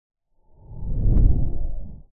Sound effects > Other
i hope will be usefull for you.